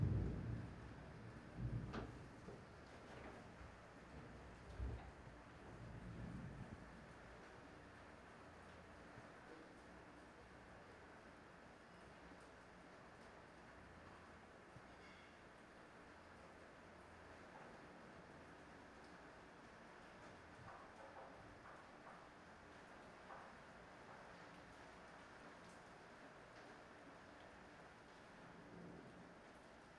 Soundscapes > Nature
Indoor rainy night. 07/16/25 Recorded with Sound Devices 633 and Audiotechnica AT875R (AB Stereo Bar).
indoor, rain, weather